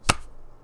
Sound effects > Objects / House appliances
GAMEMisc-Blue Snowball Microphone Card, Hit on Other Card Nicholas Judy TDC
A card being hit on another card.
card, hit